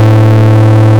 Instrument samples > Synths / Electronic
Low bass with tremolo v2
Low bass with tremolo, a low bass sine wave with trememolo and varied effects added